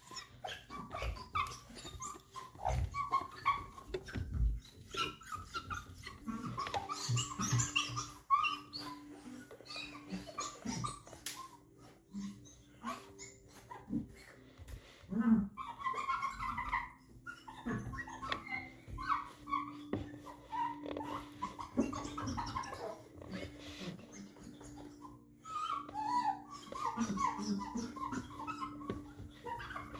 Sound effects > Objects / House appliances
OBJHsehld-Samsung Galaxy Smartphone Window, Mirror, Wiping Squeaks Nicholas Judy TDC
Window or mirror wiping squeaks.
foley, mirror, window, wipe, Phone-recording